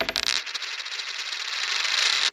Sound effects > Objects / House appliances
OBJCoin-Samsung Galaxy Smartphone, CU Penny, Drop, Spin 06 Nicholas Judy TDC
A penny dropping and spinning.